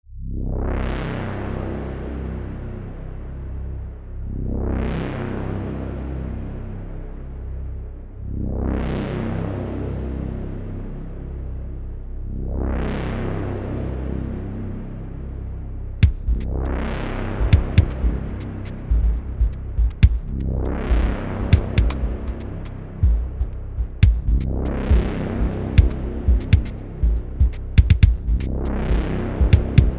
Music > Multiple instruments
Music is inspired by Metroid with the same dark vibe/feel, mysterious track. I would love to see what project you put this in. DAW: Cubase 10.5 Pro BPM: 120 Instruments: Nexus (Synthwave package).
alien, dark, darkness, futuristic, fx, game, gamemusic, horror, sci-fi, scifi, space, spaceship